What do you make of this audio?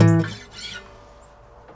Music > Solo instrument
Acoustic Guitar Oneshot Slice 58
sfx, twang, acoustic, plucked, guitar, fx, foley, chord, note, string, notes, oneshot, pluck, strings, knock